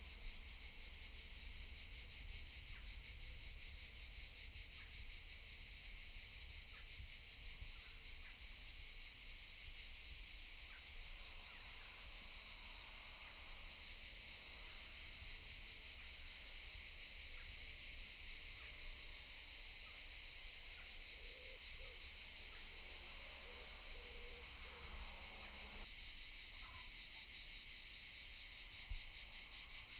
Sound effects > Natural elements and explosions
The recording was made in Italy, specifically in the countryside near Cariati marina, Calabria. Around midday in the middle of summer. In addition to the cicadas, sparse car traffic can be heard in the distance coming from State Road 106. Recorded with Sennheiser 416p and Zoom H4n.